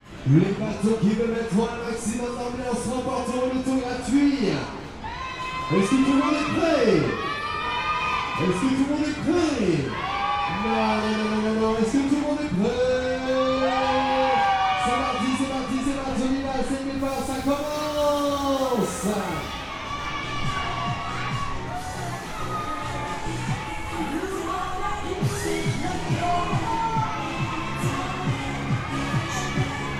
Soundscapes > Urban
250424 154622 FR Kids enjoying funfair in Paris
Kids and teen-agers enjoying a fairground ride in a funfair in Paris, France. (take 1) I made this recording while kids and teen-agers were enjoying a fairground ride in a famous funfair called ‘’la Foire du Trône’’, taking place in eastern Paris (France), every year during late spring. Recorded in April 2025 with a Zoom H6essential (built-in XY microphones). Fade in/out applied in Audacity.
attraction, rollercoaster, ride, children, funfair, machine, field-recording, kids, Paris, teen-agers, rides, noise, walla, voices, screaming, people, lively, music, amusement-park, ambience, soundscape, France, crowd